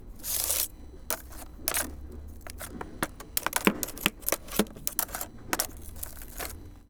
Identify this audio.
Sound effects > Objects / House appliances
Junkyard Foley and FX Percs (Metal, Clanks, Scrapes, Bangs, Scrap, and Machines) 138
tube, Bash, rattle, Smash, Clank, Bang, Metallic, waste, Atmosphere, dumpster, Ambience, Robot, dumping, Machine, scrape, Robotic, Junk, Dump, Junkyard, Percussion, Metal, Clang, rubbish, Perc, Environment, SFX, garbage, Foley, trash, FX